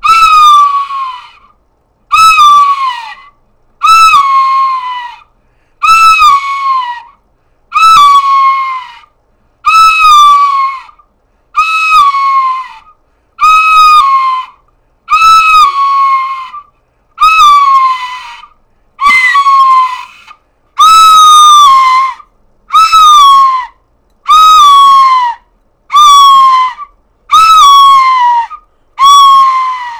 Sound effects > Animals
TOONAnml-Blue Snowball Microphone, CU Recorder, Imitation, Bird Of Prey, Screeching Nicholas Judy TDC
A recorder imitating a bird of prey screeching.
bird-of-prey, cartoon, recorder, Blue-brand, Blue-Snowball, screech, imitation